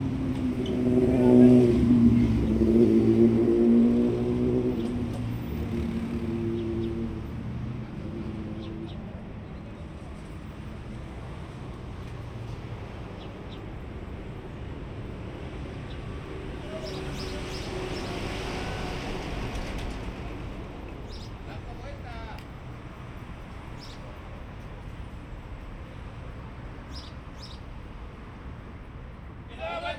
Soundscapes > Urban
Ambience Mexico Street Xochimilco
Ambience in Mexico City in tue Vaqueritos turnaround at the sidewalk with tourist guides from Xochimilco's canal making promotions.
vaqueritos, walla